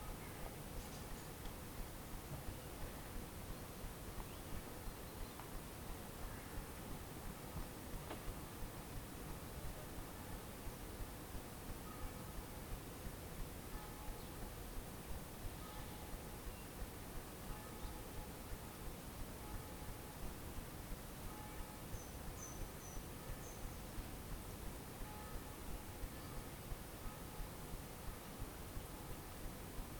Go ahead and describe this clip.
Soundscapes > Nature
20250910 11h59 Gergueil North water spot (Q4)
Subject : Ambience recording from a water source in a northern field at Gergueil. Date YMD : 2025 September 10 starting 11h59 Location : Gergueil 21410 Bourgogne-Franche-Comte Côte-d'Or France Hardware : Zoom H2n XY mode. Weather : Processing : Trimmed and normalised in Audacity. Notes : 18min25 water drop sound, similar at 21h50 at 27min too 37min20. 27min11 wood/movement sound.